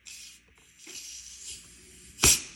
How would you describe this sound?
Sound effects > Objects / House appliances
Opening2Liter
Opening a two liter bottle of soda.
fizz, soda